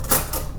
Sound effects > Objects / House appliances
knife and metal beam vibrations clicks dings and sfx-095
FX, Metal, Wobble, Vibration, ding, Perc, Klang, Trippy, Vibrate, metallic, ting, Foley, SFX, Beam, Clang